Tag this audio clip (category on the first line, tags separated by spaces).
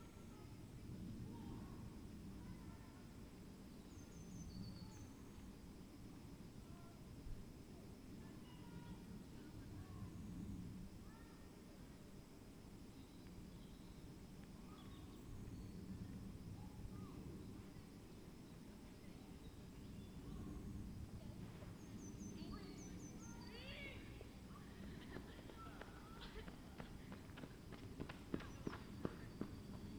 Soundscapes > Nature
natural-soundscape field-recording artistic-intervention weather-data data-to-sound nature modified-soundscape Dendrophone alice-holt-forest soundscape sound-installation phenological-recording raspberry-pi